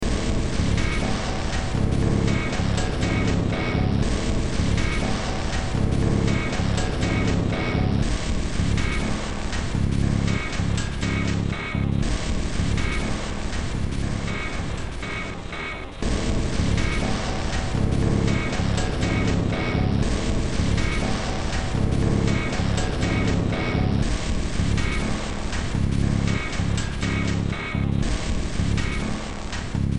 Music > Multiple instruments
Demo Track #3702 (Industraumatic)
Industrial, Underground, Cyberpunk, Noise, Games